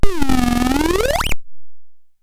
Sound effects > Electronic / Design
Optical Theremin 6 Osc dry-104
Sci-fi, Bass, noisey, Synth, Electro, Infiltrator, Glitchy, Scifi, Dub, FX, Instrument, Robotic, Trippy, Theremins, Spacey, Robot, Glitch, Analog, DIY, Noise, Otherworldly, Sweep, Theremin, Electronic, SFX, Optical, Handmadeelectronic, Alien, Experimental, Digital